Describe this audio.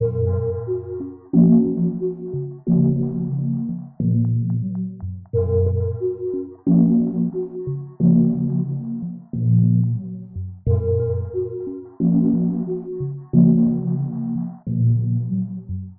Music > Solo instrument
Dark ambient loop 180bpm
Made in FL Studio with Toxic Biohazard and multiple effects, including mishby. Free for anyone to use in whatever you'd like :)
Darkambient, horror, freaky, ambient, loop, LFO, 180bpm